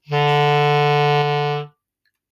Instrument samples > Wind
Clarinet Sustained D3
Clarinet D3 (written as E3). Use this sample however, you want by looping sampling. Recorded using Laptop microphone. A real sample
Clarinet, Sustained, Wind